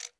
Sound effects > Other mechanisms, engines, machines
clock tickB1
single tick, isolated Works best in tandem with the paired sound (ie: clock_tickB1 and clock_tickB2) for the back and forth swing.